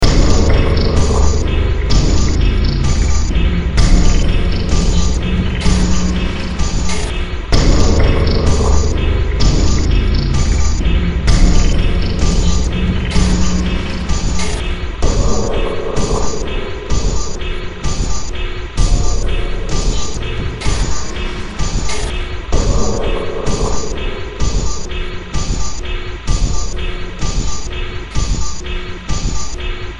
Music > Multiple instruments
Demo Track #3174 (Industraumatic)
Ambient, Horror, Noise, Sci-fi, Cyberpunk, Underground, Games, Industrial, Soundtrack